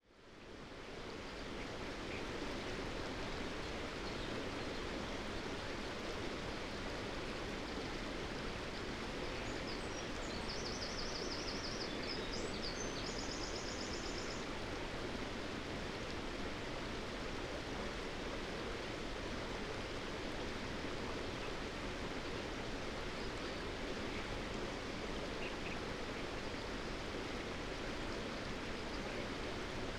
Soundscapes > Nature
Stream Running Water and Birds
Running water just down stream where there used to be a mill long time ago. It's spring time so the birds are chirping from above. The birds are much more prominent in the hight channels as a consequence. Recorded in 6 channels surround, L, R, Ls, Rs, Lt and Rt. The uploaded file has10 channels to easily fit into a Dolby Atmos bed track in the following channel layout: L, R, C, LFE, Ls, Rs, Lr, Rr, Lt, Rt. The C, LFE, Lr and Rr are left empty. Try panning the surrounds into the rear channels to get a more immersive sound.